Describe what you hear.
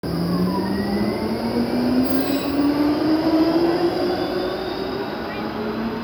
Soundscapes > Urban
tram moving near station
rail, tram, tramway